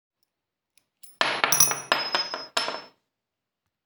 Sound effects > Objects / House appliances
rings falling
Around seven inox steel and silver rings being dropped on a wood table from low height. Variety of sounds of different sharpness and pitch.
drop, falling, metal, metallic, ring, rings, sharp, silver, steel, table